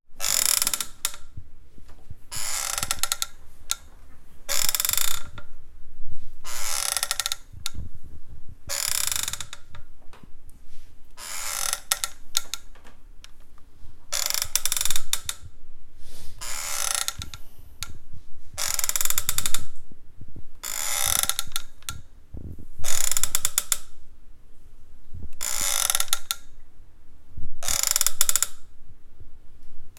Sound effects > Objects / House appliances
A creaking stool. Wooden Surface creaking.
Wooden, Creak